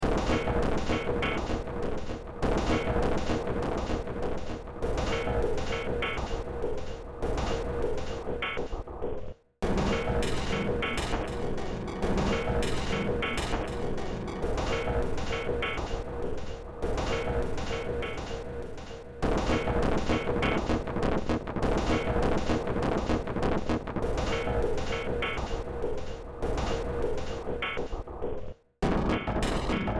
Music > Multiple instruments
Short Track #3931 (Industraumatic)
Ambient, Noise, Horror, Games